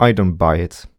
Speech > Solo speech
Doubt - I dont buy it
NPC, Video-game, FR-AV2, Human, Neumann, Vocal, talk, U67